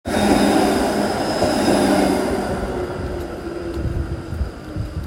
Sound effects > Vehicles
tram sunny 03
motor, sunny, tram